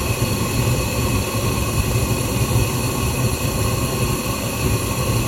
Sound effects > Natural elements and explosions
FIREGas-Samsung Galaxy Smartphone Stove, Burn, Looped Nicholas Judy TDC
A gas stove burning. Looped.
loop, gas, Phone-recording, burn, stove